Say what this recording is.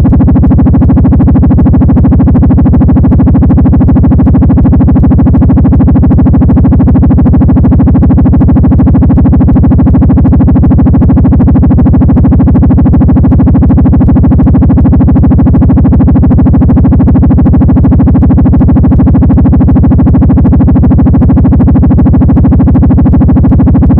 Soundscapes > Synthetic / Artificial
Drone sound 001 Developed using Digitakt 2 and FM synthesis